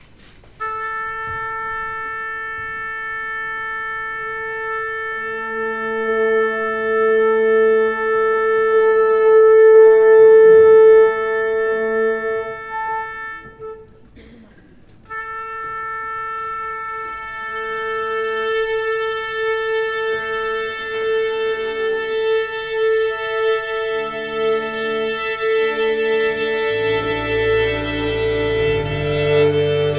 Music > Multiple instruments
orquesta sinfonica afinando en el foso. grabado en el teatro del libertador san martin, con par stereo rode nt5 y sound devices 664 ------------------------------------------------------------------------------------------------------------------- Symphony orchestra tuning in the pit. Recorded at the Libertador San Martín Theater, with a Rode NT5 stereo pair and Sound Devices 664.